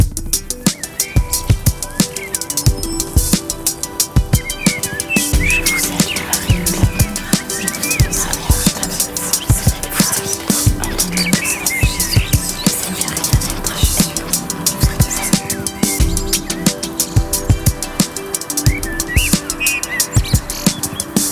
Music > Multiple instruments
bucolic vibes
A short loop made in Bitwig with natural ambience you can use for your own compositions.
Chill, Countryside